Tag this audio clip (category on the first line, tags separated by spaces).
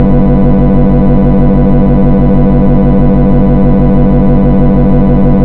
Sound effects > Other mechanisms, engines, machines
railway railroad 2-stroke notch freight locomotive mover motor engine v16 567 rail